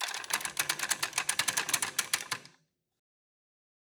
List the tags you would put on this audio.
Sound effects > Other mechanisms, engines, machines
chain
gears
loadingdoor
machinery
mechanical

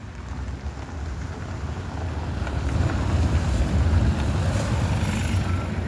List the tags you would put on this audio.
Sound effects > Vehicles
vehicle
bus
transportation

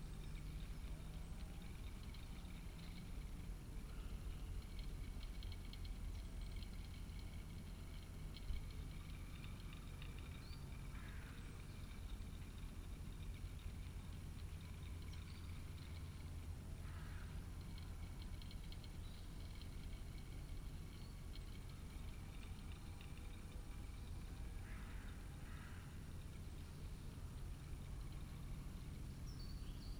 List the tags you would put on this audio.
Soundscapes > Nature
weather-data
soundscape
nature
phenological-recording
natural-soundscape
sound-installation
field-recording
raspberry-pi
data-to-sound
alice-holt-forest
artistic-intervention
Dendrophone
modified-soundscape

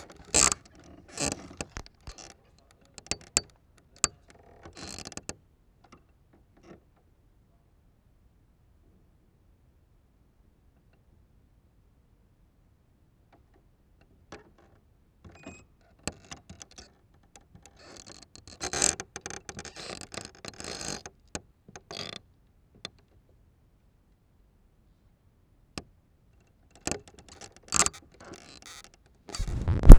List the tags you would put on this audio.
Sound effects > Objects / House appliances
wood
steps
friction

